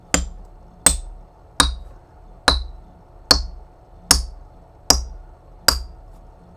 Music > Solo percussion
MUSCTnprc-Blue Snowball Microphone, CU Xylophone, Teakwood, Thai, Notes Nicholas Judy TDC
Teakwood thai xylophone notes.